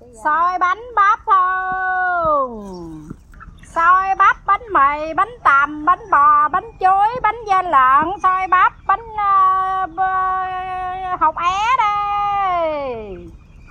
Speech > Solo speech
Đàn Bà Bán Bánh - Woman Sell Food
Woman sell food. Record use iPhone 7 Plus smart phone 2025.08.04 07:54
business, female, sell, viet, voice, woman